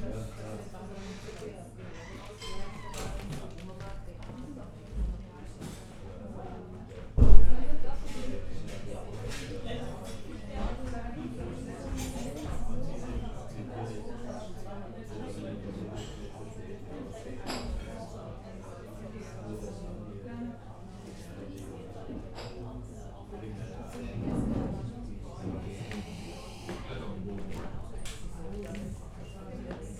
Soundscapes > Indoors
AMBRest Café Jelinek Restaurante Ambience Walla 01 Vienna
Noon Ambience at Café Jelinek Vienna recorded with Clippy EM272 in AB Stereo into ZoomF3
Bar, Cafe, Crowd, Field, Fieldrecording, Public, Restaurente, Vienna, Walla